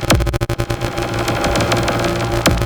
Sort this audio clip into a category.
Music > Solo percussion